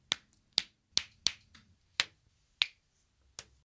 Sound effects > Human sounds and actions
Joint pops high-pitched 01
joint, joint-popping, back-crack, joints, knuckles, crack, cracking, popping, pop, back